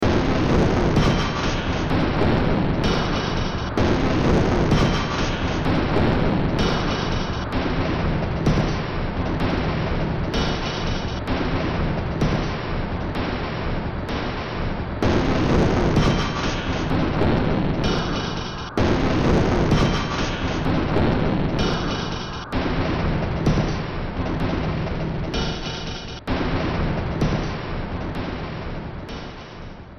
Music > Multiple instruments
Demo Track #3772 (Industraumatic)
Sci-fi, Industrial, Horror, Underground, Cyberpunk, Noise, Ambient, Soundtrack, Games